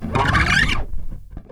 Music > Solo instrument
fret slide noise
bassline, fuzz, low, notes, slap, slide